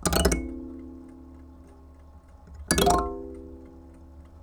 Music > Solo percussion
MUSCTnprc-Blue Snowball Microphone, MCU Kalimba, Glisses Nicholas Judy TDC
Blue-brand, gliss, kalimba, Blue-Snowball